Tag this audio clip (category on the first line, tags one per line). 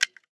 Sound effects > Human sounds and actions
button
off
switch
toggle